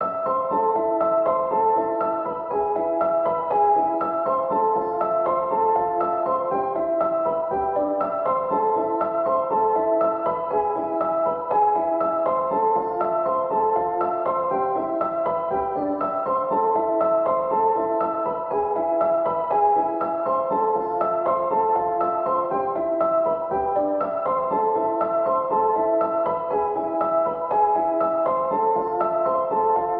Music > Solo instrument
Piano loops 169 efect 4 octave long loop 120 bpm
120, 120bpm, free, loop, music, piano, pianomusic, reverb, samples, simple, simplesamples